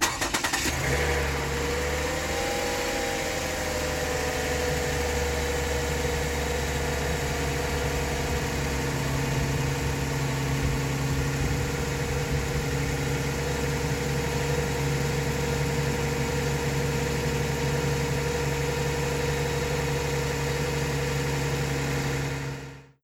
Sound effects > Vehicles
VEHCar-Samsung Galaxy Smartphone, CU Start, Idle, Toyota Highlander Nicholas Judy TDC
A toyota highlander car starting and idling.